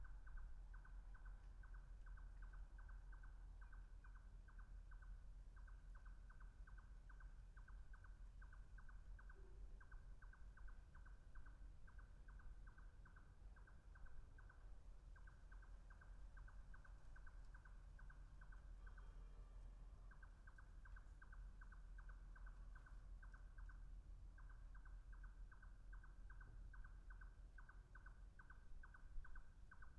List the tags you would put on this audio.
Soundscapes > Nature
ambience bird calm calma field-recording night pajaro

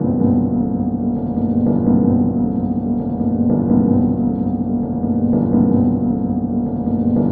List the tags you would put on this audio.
Soundscapes > Synthetic / Artificial
Ambient Dark Loopable Packs Underground

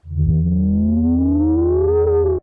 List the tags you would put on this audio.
Sound effects > Experimental
analog analogue complex dark effect electro fx korg oneshot pad snythesizer sweep vintage

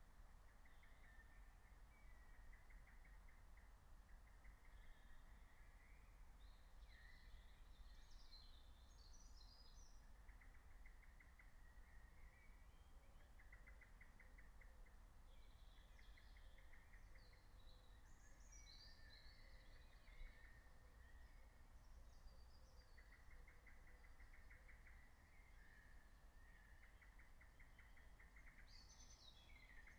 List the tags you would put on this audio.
Soundscapes > Nature
soundscape field-recording alice-holt-forest meadow raspberry-pi nature phenological-recording natural-soundscape

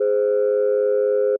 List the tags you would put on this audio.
Instrument samples > Synths / Electronic
Old-School-Telephone JI Landline-Telephone Holding-Tone JI-Third Landline Landline-Phone Landline-Telephone-like-Sound JI-3rd Landline-Phonelike-Synth just-minor-3rd Tone-Plus-386c just-minor-third Landline-Holding-Tone Synth

